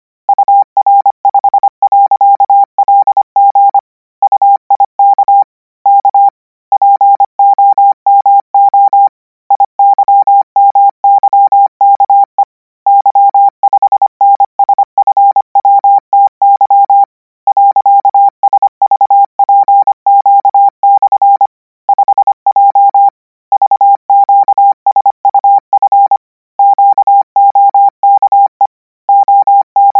Sound effects > Electronic / Design
Koch 24 KMRSUAPTLOWI.NJEF0YVGS/Q - 680 N 25WPM 800Hz 90%
Practice hear characters 'KMRSUAPTLOWI.NJEF0YVGS/Q' use Koch method (after can hear charaters correct 90%, add 1 new character), 680 word random length, 25 word/minute, 800 Hz, 90% volume. Code: ur5.lg uik k pomo iymyke y5nsfwty .svpq/ 5j vqsuf qoke om ju k kqlvwpil lj/snm /lqtkjk l qug l5pllfe f. pw .ue ujoug pu ao5n w wyu0p amvtfts otnyu gwp5ttwg glvpuu. mp l/r. i i/e5ym tmv0nun5e 0vkwgp 5afos gp ugua i/ia.lwm /vvq5t5ru wanp ge/ iv eyig/v/ei r/.qaor uut0wm yq55va.a ksgq t.55 .soj5av e5put r5fqmnk f50i 5kyn.n/vw ppivut rm w0ok0sfp vvoi /ky igo0r . vqm5t j0sqgg ot0yv oil//uqt ii5/i vyrmi 0l0 omls./u pynr gkg /vw j5jllgm nf0pfn joo. jj rpyr auoimqvri r0u.q.mwy ipp .ggvpjeii /.lyp po.s5 ej wf0 ei5ill jeumlr f..si5l w.5kytoil q sqagng euwn. mfwekf unyjnv05 fyjtqw vygku . 0s5 rv/ v05ji /0greu impvrmwj vqflpiuu qnja ivrt5 uig ylqvya n50/ gyqg urj5 oqa low5pgaa qwla mvrnn0o// .v nwj.
codigo, characters, code, morse, radio